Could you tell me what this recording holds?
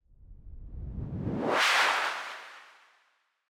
Sound effects > Electronic / Design
Slow-Swoosh
A long rise swoosh sound made in Ableton.